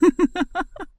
Sound effects > Human sounds and actions
Woman giggling 1
A woman giggling, recorded indoor, background noises removed.